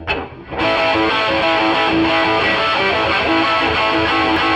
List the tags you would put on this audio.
Music > Solo instrument
distorted guitar elec

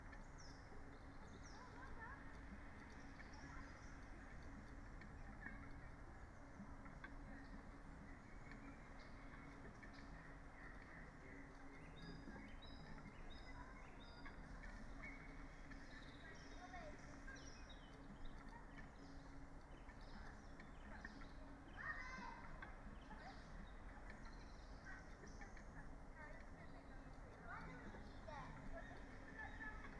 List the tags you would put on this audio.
Soundscapes > Nature
modified-soundscape soundscape alice-holt-forest sound-installation nature Dendrophone raspberry-pi phenological-recording field-recording weather-data artistic-intervention data-to-sound natural-soundscape

